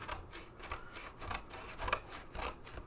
Sound effects > Objects / House appliances
OBJOffc-Blue Snowball Microphone Scissors Cutting Cardboard Nicholas Judy TDC
Scissors cutting cardboard.
cut, Blue-brand, foley